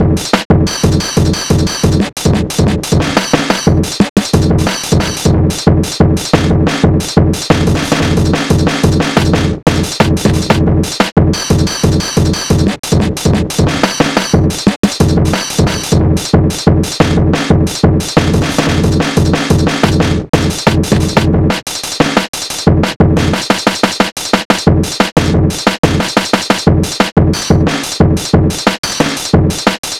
Music > Solo percussion
main beat - Sewerpvsher
the main beat in my song "Sewerpvsher", made with bandlab drum machine
punchy, 4-4, drumnbass, drillnbass, loop, 180bpm